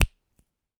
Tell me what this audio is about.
Sound effects > Human sounds and actions
Kid Fingersnap 3
Subject : A fringer-snap from nearly teen kid. Date YMD : 2025 04 20 Location : Gergueil France. Hardware : A Zoom H2n in MS mode. If Mono, Mid mic only, if stereo, well I processed the sound using Mid and side to make a stereo recording. Weather : Processing : Trimmed and Normalized in Audacity.
child, click, finger, finger-snap, fingersnap, H2N, kid, snap, Zoom